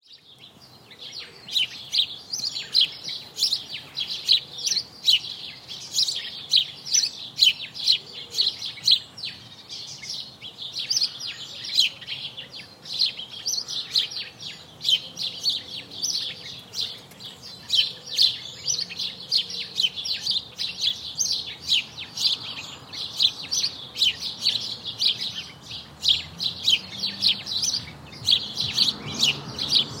Soundscapes > Nature
Kinver - Blackbirds Sing in Spring
Blackbirds sing in the garden in spring.
bird, birdsong, blackbird, england, nature, uk, village